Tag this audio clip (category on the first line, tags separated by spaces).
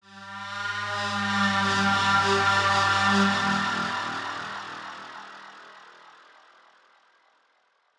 Sound effects > Electronic / Design
psyhedelic sfx fx sound-design sound electric effect abstract efx psytrance soundeffect sounddesign sci-fi psy